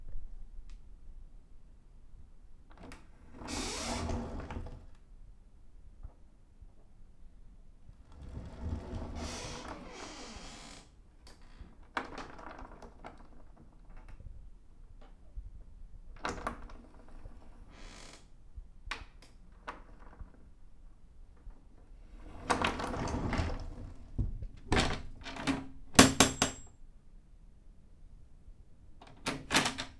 Sound effects > Other mechanisms, engines, machines
An indoors wooden, folding door, opening and closing many times. Recorded with Zoom H2.